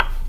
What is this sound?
Sound effects > Other mechanisms, engines, machines
shop foley-019
tools, crackle, metal, bop, rustle, foley, strike, tink, perc, sound, little, fx, sfx, shop, percussion, bang, thud, wood, boom, pop, knock, oneshot, bam